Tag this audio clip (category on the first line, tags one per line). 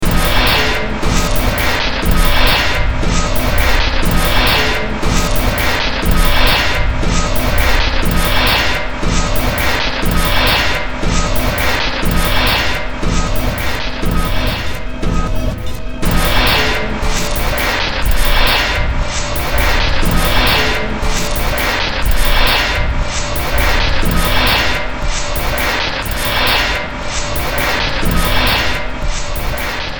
Music > Multiple instruments
Ambient
Cyberpunk
Horror
Noise
Sci-fi
Soundtrack
Underground